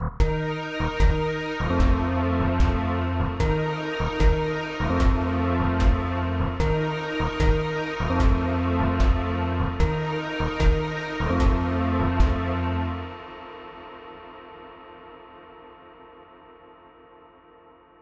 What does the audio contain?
Music > Multiple instruments
January groove - 120 bpm
Another BeepBox experiment: a mix of synth strings, bells, bass, and drums to provide a background groove, sample, or loop. 120 bpm, 4/4, D sharp/E flat major. Made with BeepBox, polished with BandLab.
music, 120bpm, groovy, synth, electronic, rhythmic, loop